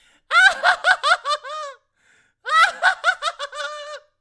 Sound effects > Human sounds and actions
puppet laugh 2
giggle, laugh